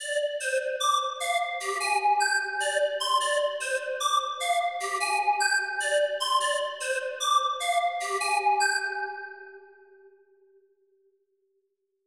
Music > Solo instrument
pied piper
The elders hired the piper to chase away the rats but soon all of the villagers are following his strange and hypnotic notes. Made with BeepBox and BandLab.